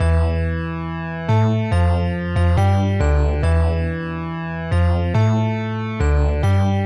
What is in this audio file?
Music > Multiple instruments
Bass And Bells Grime Loop - 140 BPM
A grimey 140 bpm loop, with a layered Bass Synth and Bell
Jungle, Bass, Garage, Trap, Bpm, 808, Synth, Bell, Grime, 140